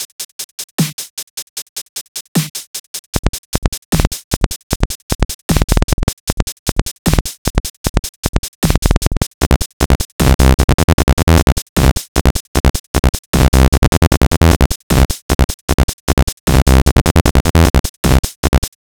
Music > Multiple instruments
Glitchy beat
This is a beat 153 bpm, produced on FL Studio Daw, with the bassline sound of the plugin Harmor, with the Effect of grossbeat.